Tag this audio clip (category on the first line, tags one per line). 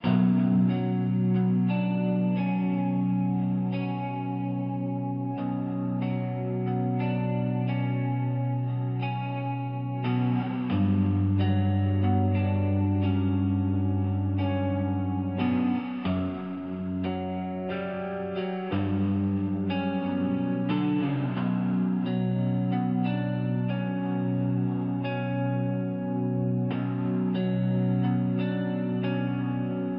Music > Other
BM
depressive
electric
guitar
sample